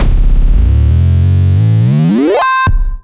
Instrument samples > Synths / Electronic
CVLT BASS 11
wobble, subbass, lfo, subs, bassdrop, wavetable, lowend, bass, clear, stabs, sub, synthbass, low, drops, synth, subwoofer